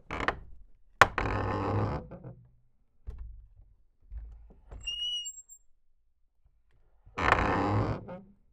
Sound effects > Objects / House appliances
Old cave door (Handheld) mono - closing and opening top hinge 2
Subject : Door sounds opening/closing Date YMD : 2025 04 22 Location : Gergueil France Hardware : Tascam FR-AV2 and a Rode NT5 microphone. Weather : Processing : Trimmed and Normalized in Audacity. Maybe with a fade in and out? Should be in the metadata if there is.
Dare2025-06A, Door, FR-AV2, hinge, indoor, NT5, Rode, Tascam